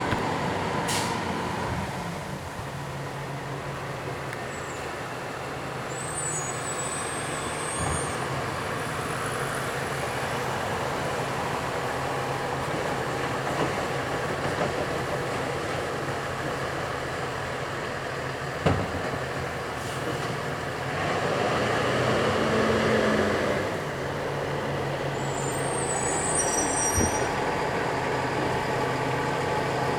Soundscapes > Urban
A City of Whitehorse municipal garbage truck moves down a residential street in Riverdale, picking up and emptying compost carts with its mechanical arm. Recorded from second-floor window on handheld Zoom H2n in 30-degree stereo mode.